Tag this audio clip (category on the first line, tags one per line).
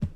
Sound effects > Objects / House appliances
drop
metal
bucket
tool
spill
tip
object
plastic
carry